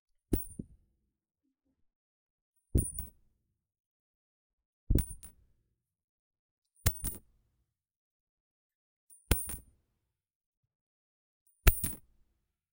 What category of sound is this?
Sound effects > Objects / House appliances